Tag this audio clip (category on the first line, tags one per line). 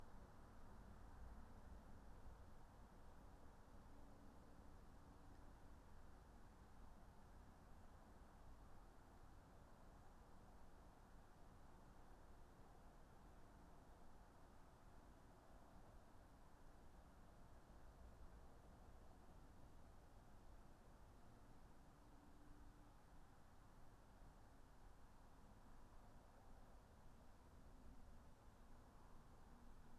Soundscapes > Nature
alice-holt-forest
meadow
natural-soundscape
raspberry-pi